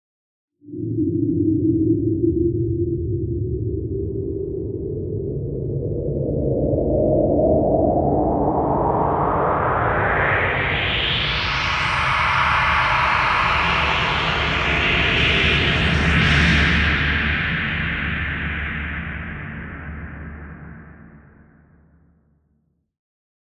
Sound effects > Electronic / Design
FX Solar Windz Swipesweeper LR-Panning
Layered sweeping whoosh with left-right panning, airy and futuristic.
abstract, atmosphere, cinematic, digital, effect, experimental, fx, gaming, noise, processed, sci-fi, space